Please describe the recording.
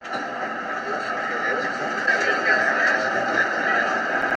Sound effects > Vehicles
tram sounds emmanuel 3
line, tram